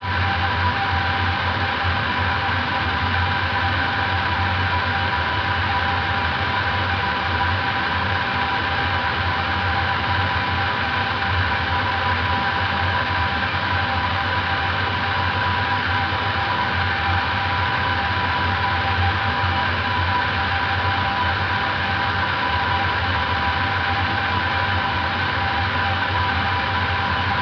Soundscapes > Synthetic / Artificial
IDM Atmosphare3
Synthed with PhasePlant Granular
Ambient, Atomsphare, IDM, Noise